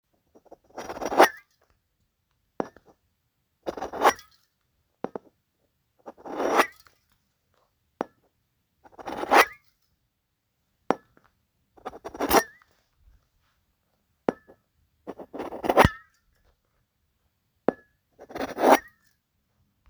Sound effects > Objects / House appliances
This sound was made by shakily scraping the bottom of an aluminum deodorant can on my wooden floor, and then lifting it up so you can hear the can resonate. Use this sound however you like. Recording device used: Phone Microphone